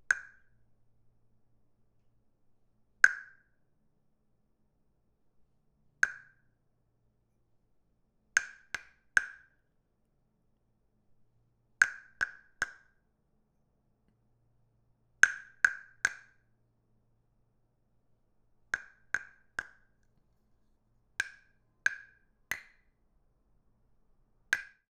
Instrument samples > Percussion
Percussion Wooden Frog 1
recorded with Zoom H4n recorder and Sennheiser MKH 416 Shotgun Condenser Microphone wooden stick hits wooden frog